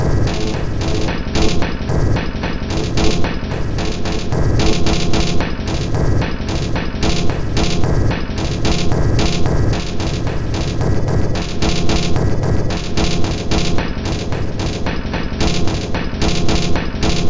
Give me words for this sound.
Instrument samples > Percussion
This 111bpm Drum Loop is good for composing Industrial/Electronic/Ambient songs or using as soundtrack to a sci-fi/suspense/horror indie game or short film.
Soundtrack, Ambient, Loopable, Dark, Drum, Samples, Underground, Weird, Alien, Packs, Industrial, Loop